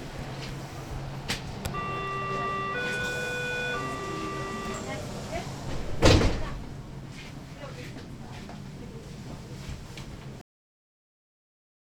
Soundscapes > Urban
I recorded this while visiting Berlin in 2022 on a Zoom field recorder.